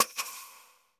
Sound effects > Other
Reminiscent of "that" game...but meticulously crafted from original sources.
shot, spell, projectile, arrow, interface